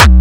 Instrument samples > Percussion
OldFiles-Classic Crispy Kick 1-B
brazilianfunk, Crispy, Distorted, Kick, powerful, powerkick